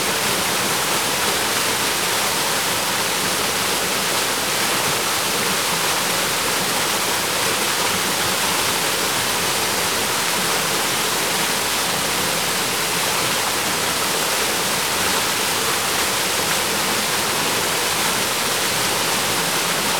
Soundscapes > Nature
Ambiance Waterfall Moihnos Cascata do Limbo Loop Stereo
Waterfall - Close Recording - Loop Recorded at Cascata do Limbo, São Miguel. Gear: Sony PCM D100.
ambience, azores, cascatadolimbo, creek, environmental, fieldrecording, flow, forest, loop, moinhos, natural, nature, park, portugal, relaxation, river, saomiguel, soundscape, stereo, stream, water, waterfall